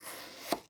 Sound effects > Other
Soft chop vegetable 3
Cook, Chef, Chop, Knife, Chief, Chopping, Kitchen, Soft, Indoor, Vegetable, Cooking